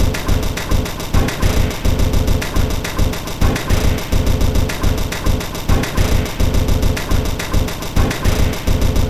Instrument samples > Percussion
This 211bpm Drum Loop is good for composing Industrial/Electronic/Ambient songs or using as soundtrack to a sci-fi/suspense/horror indie game or short film.
Ambient, Samples, Underground